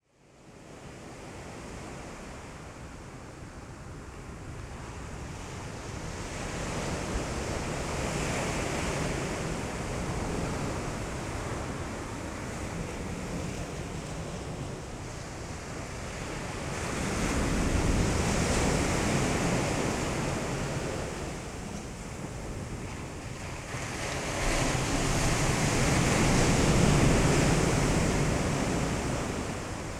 Soundscapes > Nature
250730 055349 PH Ocean waves at White Beach
Ocean waves at White Beach, Puerto Galera (binaural, please use headset for 3D effects). I made this recording in the morning, from the terrace of an hotel located at White Beach, a beautiful sand beach in the surroundings of Puerto Galera (Oriental Mindoro, Philippines). Recorded in July 2025 with a Zoom H5studio and Ohrwurm 3D binaural microphones. Fade in/out and high pass filter at 60Hz -6dB/oct applied in Audacity. (If you want to use this sound as a mono audio file, you may have to delete one channel to avoid phase issues).
splashing, surfing, splash, binaural, field-recording, morning, ambience, Pacific, Philippines, atmosphere, ocean, Puerto-Galera, sand, soundscape, beach, white-noise, surf, sea-waves, waves, sea, shore, relaxing, wave, coast, nature